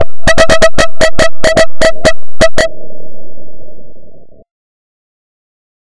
Sound effects > Other
Strange waveform (again)
Well, here is another "strange" sound Ignore the waveform I made this sound with plugins FLEX (in FL Studio of course) and Fruity Blood Overdrive (the mid big circle on the maximum and 100X on), and in FLEX i put the pitch to -24 cents and put the sound on the C0 note (yes, it exists)